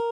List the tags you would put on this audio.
Instrument samples > String
arpeggio cheap design guitar sound stratocaster tone

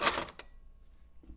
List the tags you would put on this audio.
Sound effects > Objects / House appliances
Foley Klang Clang FX Metal metallic Wobble Trippy ding SFX Beam Vibrate ting Vibration Perc

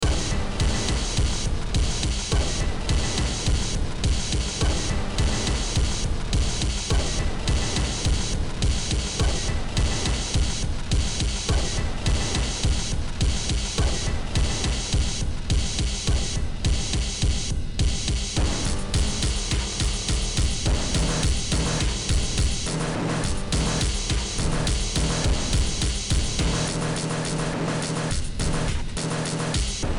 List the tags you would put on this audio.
Music > Multiple instruments
Noise Sci-fi Horror Industrial Underground Cyberpunk Games Soundtrack Ambient

